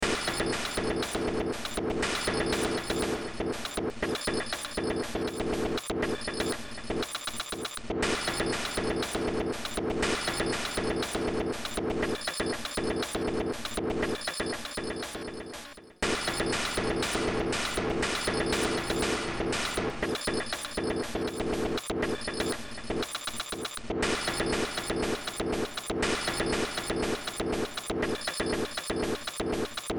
Music > Multiple instruments
Short Track #4019 (Industraumatic)
Noise, Industrial, Games, Soundtrack, Cyberpunk, Horror, Ambient, Underground, Sci-fi